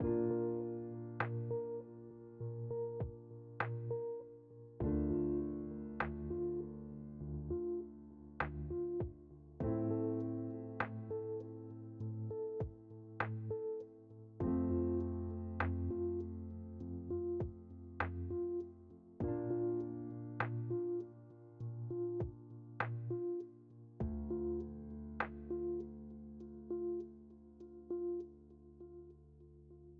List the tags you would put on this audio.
Music > Multiple instruments
lo-fi
rhodes
lofi
soft
piano
ambient

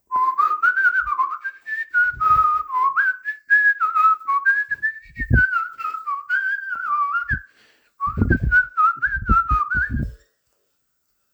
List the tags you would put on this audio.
Sound effects > Human sounds and actions
whistling
sfx
melody
fx
whistle

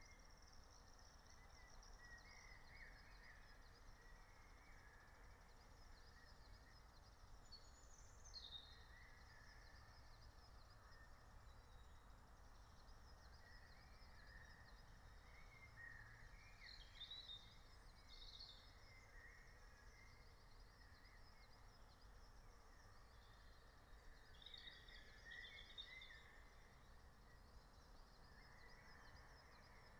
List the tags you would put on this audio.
Soundscapes > Nature
natural-soundscape
soundscape
meadow
phenological-recording
field-recording
raspberry-pi
alice-holt-forest
nature

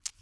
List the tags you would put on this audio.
Sound effects > Experimental
onion
punch
vegetable

